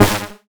Instrument samples > Synths / Electronic
CINEMABASS 2 Ab
additive-synthesis, bass, fm-synthesis